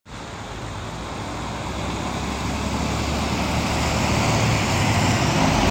Soundscapes > Urban
Bus passing by 2 11
Where: Tampere Keskusta What: Sound of a bus passing by Where: At a bus stop in the morning in a mildly windy weather Method: Iphone 15 pro max voice recorder Purpose: Binary classification of sounds in an audio clip
traffic, bus